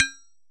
Sound effects > Objects / House appliances
Empty coffee thermos-001
sampling, recording, percusive